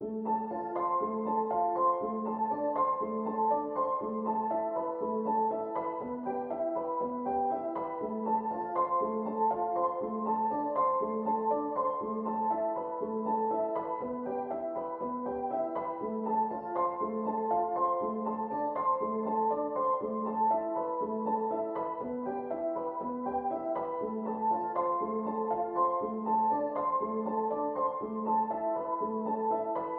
Music > Solo instrument
Piano loops 194 efect octave long loop 120 bpm
120, 120bpm, free, loop, music, piano, pianomusic, reverb, samples, simple, simplesamples